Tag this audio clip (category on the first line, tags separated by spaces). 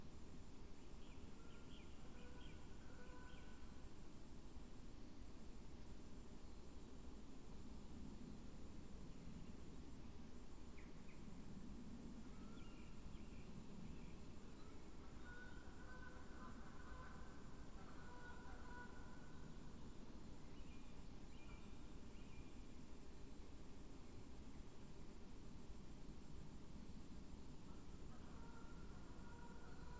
Soundscapes > Nature
artistic-intervention weather-data sound-installation Dendrophone field-recording alice-holt-forest nature data-to-sound raspberry-pi modified-soundscape natural-soundscape phenological-recording soundscape